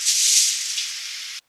Sound effects > Vehicles
Cart Shuffle
Samples recorded during my time as a cashier summer 2017 newly mixed and mastered for all your audio needs.